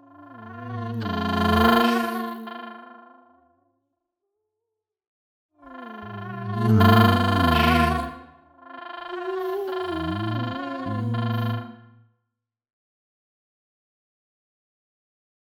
Sound effects > Electronic / Design
warped wave vox alien 1200
Sequences of glitchy alien tones and fx created with Wavewarper 2 and other vst effects in FL Studio and further processed with Reaper
alien, ambience, analog, bass, creature, creepy, dark, digital, experimental, extraterrestrial, fx, glitch, glitchy, gross, industrial, loopable, machanical, machine, monster, otherworldly, sci-fi, sfx, soundeffect, sweep, synthetic, trippy, underground, warped, weird, wtf